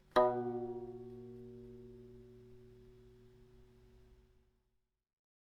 Instrument samples > String
Plucking the string(s) of a broken violin.